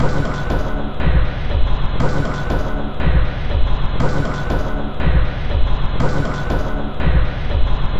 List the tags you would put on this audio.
Instrument samples > Percussion
Underground Loopable Samples Alien Soundtrack Weird Drum

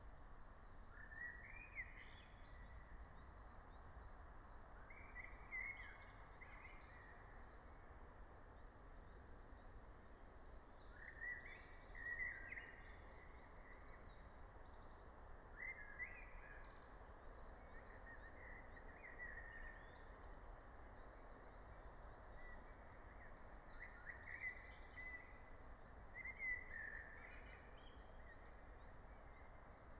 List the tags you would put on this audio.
Soundscapes > Nature
phenological-recording meadow soundscape alice-holt-forest raspberry-pi field-recording nature natural-soundscape

